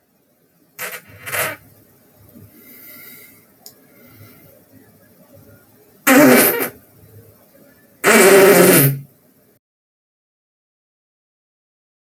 Sound effects > Other
Eighth fart of 2025